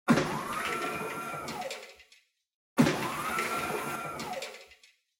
Sound effects > Other mechanisms, engines, machines
Aged Scifi Sliding Door Open and Close
A person sized Scifi sliding door with a wethered slightly rattly lived-in sound. Great for Video Games.
electronic, sci-fi, motor, door, soundeffects